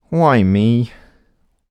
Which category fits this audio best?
Speech > Solo speech